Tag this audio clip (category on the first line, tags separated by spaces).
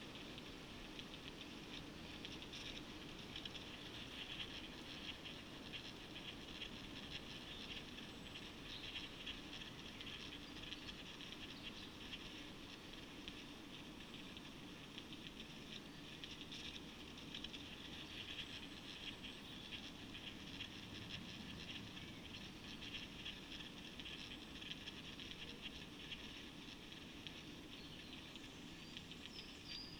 Soundscapes > Nature
alice-holt-forest Dendrophone natural-soundscape nature phenological-recording raspberry-pi sound-installation weather-data